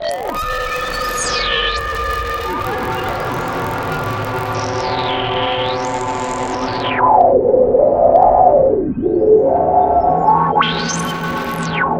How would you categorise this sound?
Sound effects > Electronic / Design